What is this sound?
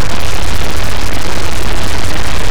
Sound effects > Electronic / Design
Weird glitch
i made this in audacity while testing my microphone
Bye, Experiment, Funny, Glitch, Glitchy, Idk, Ok, Strange, Test, Weird